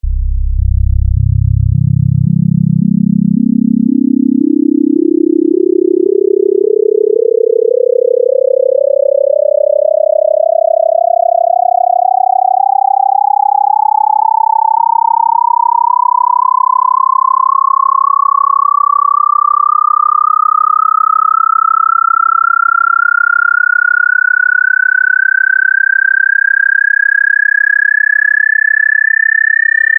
Instrument samples > Synths / Electronic
06. FM-X RES1 SKIRT2 RES0-99 bpm110change C0root
FM-X, MODX, Montage, Yamaha